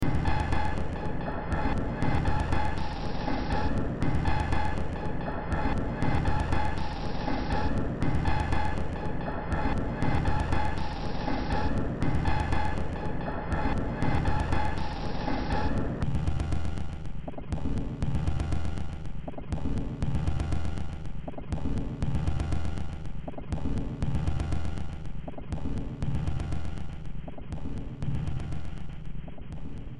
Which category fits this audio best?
Music > Multiple instruments